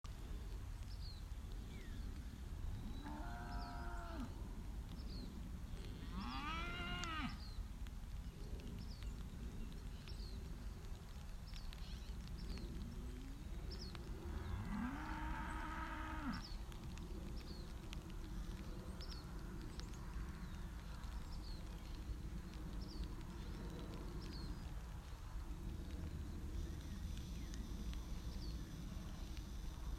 Soundscapes > Nature
Cows and sheep , hungry !!!